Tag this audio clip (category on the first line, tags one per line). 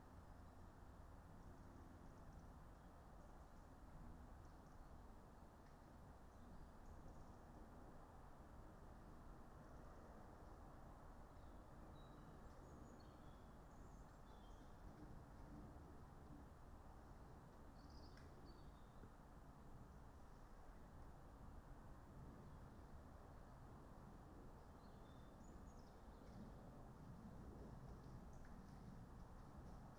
Soundscapes > Nature
alice-holt-forest
sound-installation
artistic-intervention
modified-soundscape
weather-data
field-recording
Dendrophone
nature
raspberry-pi
phenological-recording
soundscape
data-to-sound
natural-soundscape